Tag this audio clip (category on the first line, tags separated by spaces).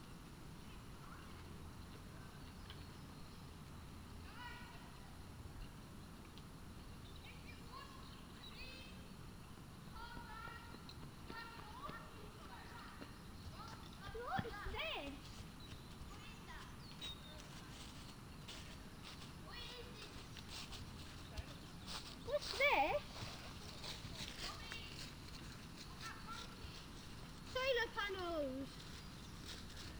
Soundscapes > Nature
artistic-intervention field-recording raspberry-pi